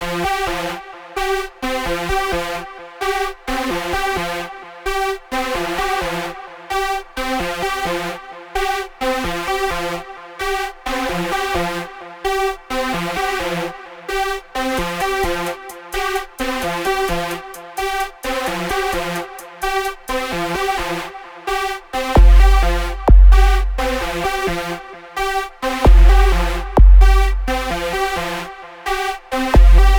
Music > Other
Made in Fl Studio, i will not describe anything in the description, how i made this song, because it will take ages. I know, that there are 13 Patterns, 20+ of plugins, and 2 minutes of the song Some sound effects used from FL Studios' FLEX Midi Library I somehow made this in 130 bpm I tried to do the best song